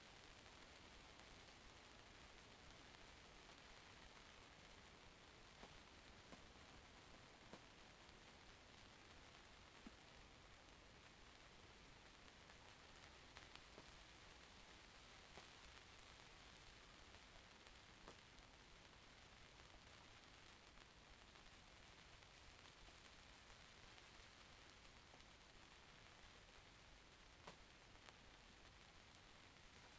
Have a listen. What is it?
Soundscapes > Nature
24h ambiance pt-04 - 2025 04 16 03h00 - 06H00 Gergueil Greenhouse
April, weather, raining, Rural, green-house, windless, early-morning, country-side, MS, rain-shower, Zoom-H2N, Night, over-night, H2N, Gergueil, Bourgogne-Franche-Comte, 2025, plastic, France, field-recording, Mid-side, 21410, rain, Cote-dor